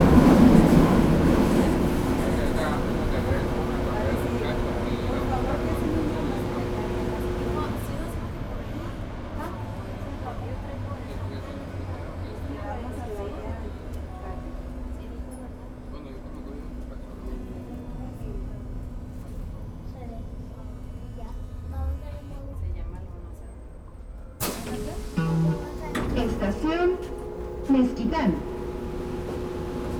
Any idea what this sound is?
Soundscapes > Urban
Public address system announcing arrival to Mezquitán train station in Guadalajara, México.